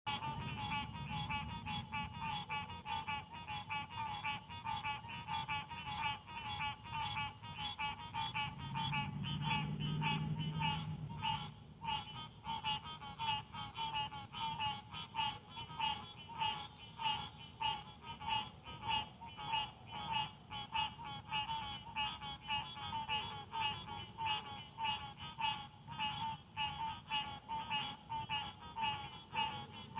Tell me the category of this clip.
Sound effects > Animals